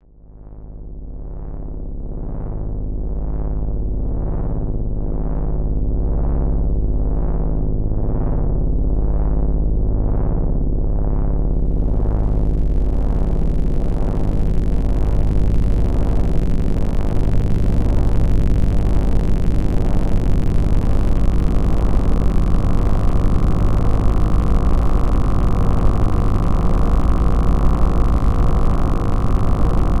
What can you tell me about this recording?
Soundscapes > Other
Deep Evolving Drone Bass
A deep evolving sequence of Bass tones and harmonics, created using several instances of Phase Plant and Pigments, processed further in Reaper. Enjoy~